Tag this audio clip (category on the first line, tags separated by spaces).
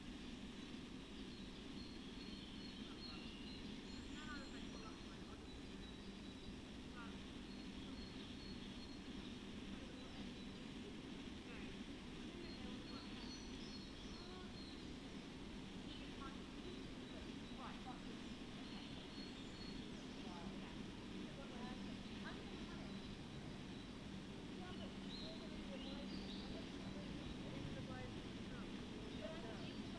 Soundscapes > Nature
alice-holt-forest nature natural-soundscape artistic-intervention raspberry-pi soundscape sound-installation modified-soundscape weather-data phenological-recording data-to-sound Dendrophone field-recording